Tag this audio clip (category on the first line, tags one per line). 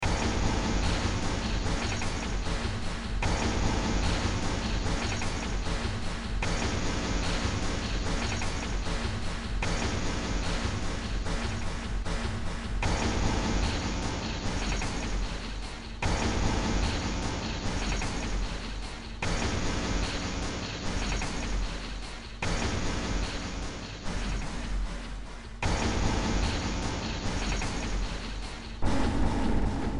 Music > Multiple instruments
Soundtrack
Games
Noise
Horror
Ambient
Sci-fi
Industrial
Underground
Cyberpunk